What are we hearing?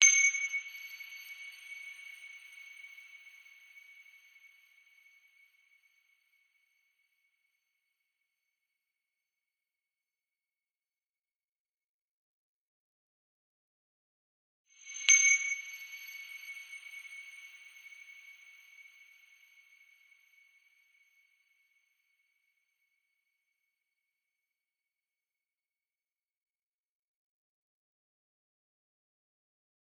Sound effects > Electronic / Design
MAGShim Sparkling Twinkle Bleep 1 EM
Sparkling bright ding sound. Made from the processed sound of a self-tapping screw falling on a ceramic tile floor. It can be useful in various kinds of sound design. I ask you, if possible, to help this wonderful site (not me) stay afloat and develop further. Enjoy it! I hope that my sounds and phonograms will be useful in your creativity. Note: audio quality is always better when downloaded.
sparkle, sound-design, chime, atmosphere, effect, shimmer, atonal, glimmer, pad, ambience, trailer, magic, noise, glassy, crystal, mark-tree, twinkle, wind-chime, chime-tree, bar-chimes, tinkle, cinematic, ambient, sound, element, nail-tree